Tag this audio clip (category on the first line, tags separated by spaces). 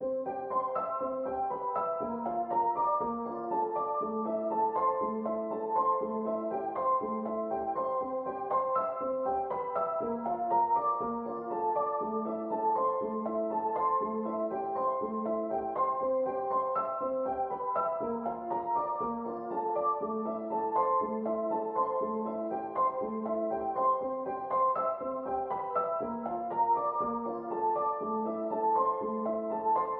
Music > Solo instrument
music loop 120 free reverb simplesamples 120bpm samples piano